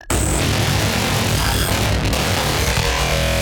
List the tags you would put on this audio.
Music > Other
loop
noise

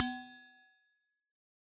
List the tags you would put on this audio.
Sound effects > Objects / House appliances
percusive
recording

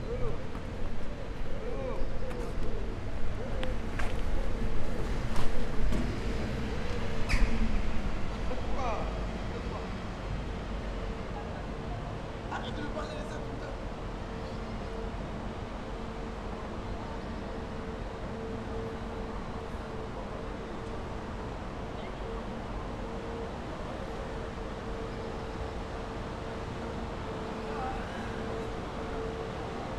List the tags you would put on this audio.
Soundscapes > Urban
Single-mic-mono
City
FR-AV2
2025
Occitanie
NT5
handheld
ambience
WS8
Early
Place-du-Vigan
hand-held
81000
Tarn
Early-morning
morning
Mono
Square
Outdoor
Albi
France
Wind-cover
Tascam
field-recording
Rode

